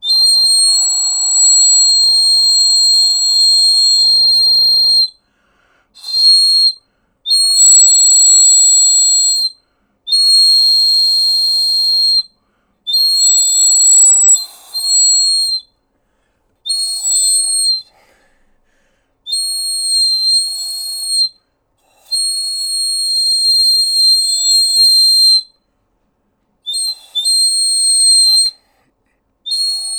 Sound effects > Objects / House appliances
WHSTMech-Blue Snowball Microphone, CU Dog Whistle Nicholas Judy TDC
A dog whistle.
Blue-brand, canine, dog, Blue-Snowball, whistle